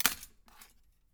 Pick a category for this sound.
Sound effects > Other mechanisms, engines, machines